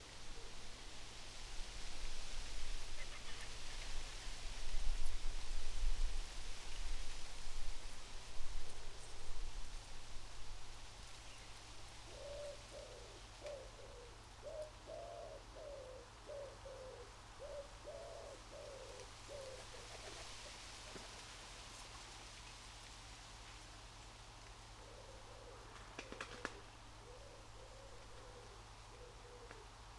Sound effects > Natural elements and explosions
Birdsong,Wind Noise and Footsteps
Recorded in mono with an SE7
birdsong, nature, wildlife